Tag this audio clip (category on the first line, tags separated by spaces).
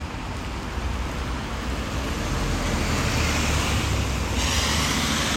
Sound effects > Vehicles
automobile car outside vehicle